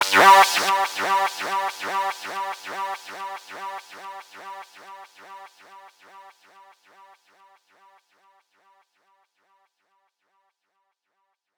Sound effects > Electronic / Design
Psytrance One Shot 01
145bpm, goa, goa-trance, goatrance, lead, psy, psy-trance, psytrance, trance